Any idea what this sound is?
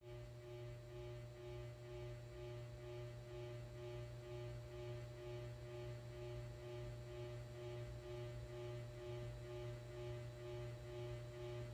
Soundscapes > Indoors
My Room Ambience
My loud room at home, recorded using my iPhone 13. There's the hum of my fan, as well as other general humming. I think I was stoned when I recorded this because I felt like my room was really loud, and wanted to see if it still sounded loud when I was not high. It does.
ambience, fan, general-noise, room-tone